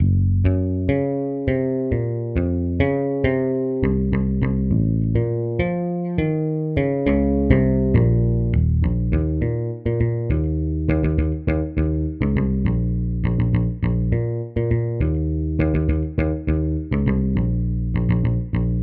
Music > Solo instrument
Apple A Day Bassline 2 - 102BPM A# Minor
Two bass guitar riffs in A# minor at 102 BPM. Made using the Bass Guitar pack for Spitfire LABS in REAPER. Second of two parts.
102, basslines, riff